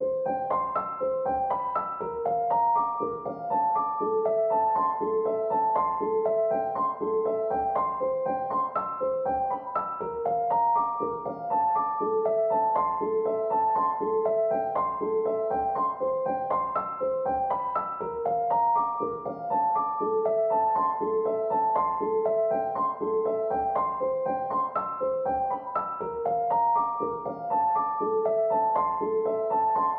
Music > Solo instrument
Piano loops 196 octave up long loop 120 bpm
120bpm, loop, music, pianomusic, samples, reverb, simple, piano, 120, simplesamples, free